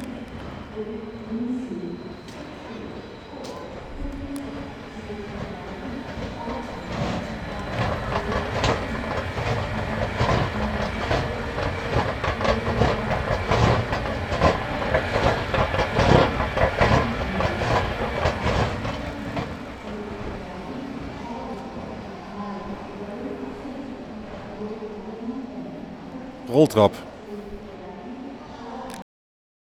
Soundscapes > Urban
Berlin - escalator hard
I recorded this while visiting Berlin in 2022 on a Zoom field recorder. This is the sound of an escalator at one of the metro stations.
traveling, public-transport, fieldrecorder, berlin, germany